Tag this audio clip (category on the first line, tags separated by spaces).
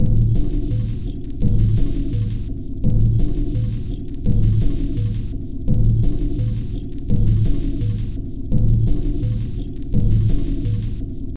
Soundscapes > Synthetic / Artificial
Alien Industrial Loopable Soundtrack Samples Underground Packs Drum Weird Dark Ambient Loop